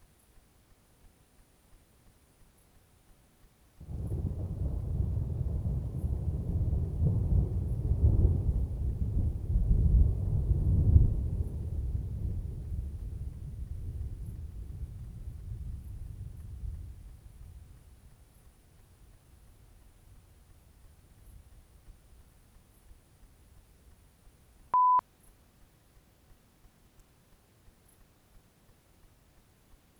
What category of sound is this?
Soundscapes > Nature